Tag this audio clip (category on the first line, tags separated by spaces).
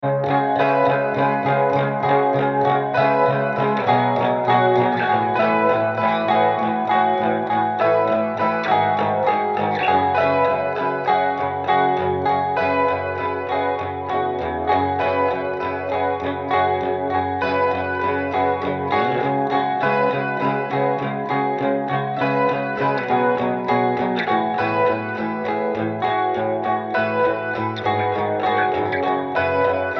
Music > Solo instrument
2000s drum-less guitar guitar-loop loop mellow music no-drums rock sample sampling tune